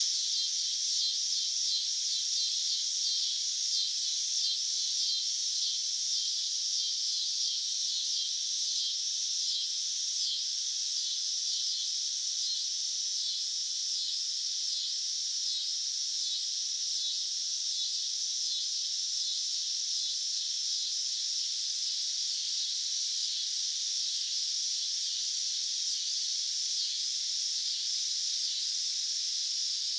Soundscapes > Urban
AMBInsc Cicada Chorus Twilight Birds Insects Mid-August Kansas City Humid Usi Pro ORTF RambleRecordings
This is the sound of an early evening cicada chorus in Kansas City, Missouri. This was recorded on a Tuesday evening, around 19:00h in mid-August. While the cicadas dominate the sound, there are some bird sounds mixed in around #4:30. My mics are a pair of Uši Pros, mounted magnetically to the railing of a tall patio, about 1 meter apart and about 5 meters off the ground. The audio was lightly processed in Logic Pro, just to cut the lows and very slightly boost the mid-high frequencies where the cicadas were making the most noise. The weather was in the high 20s celsius, humid, still, and cloudless.
cicadas, twilight, insect, insects, field-recording, cicada, night, ambience, evening, summer, nature, birds, urban